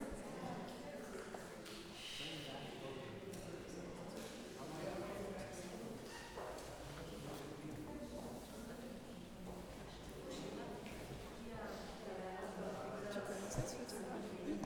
Soundscapes > Indoors
Some snippets of talking recorded in an art gallery in central London. lots of overlapping echoing voices, mostly indiscernible. Unprocessed sound, captured with a Zoom H6